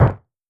Sound effects > Human sounds and actions
Shoes on gravel, walking. Lo-fi. Foley emulation using wavetable synthesis.